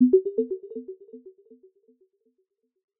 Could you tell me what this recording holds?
Sound effects > Electronic / Design
Pause Game (Gentle Echoes) 1
ui-navigation-sound-effects, game-pause, pause, pause-game-button, pause-video-game, pause-game-sound, pause-alert-sound-effects, game-pause-sound, pause-computer-game, ui-navigation, pause-alert-sound, pause-the-game, pause-game, pause-game-sound-effect, pause-game-sound-effects, hit-pause-button, pause-game-audio-effect, pause-game-sounds, pause-ui-navigation, ui-navigation-sounds, ui-navigation-audio, pause-game-screen, pause-alter-sounds, pause-button